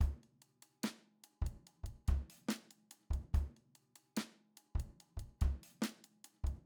Music > Solo percussion
Short loop 72 BPM in 4
Drum loop sample from recent studio session
drums, kit, live, loop, recording, studio